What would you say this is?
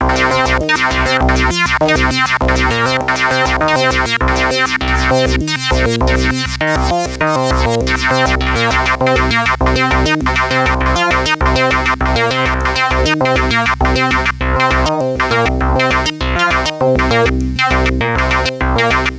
Music > Solo instrument
100 D# Polivoks Brute 05
Analog, Texture, Casio, Analogue, Electronic, Loop, Vintage, Polivoks, 80s, Soviet, Brute, Melody, Synth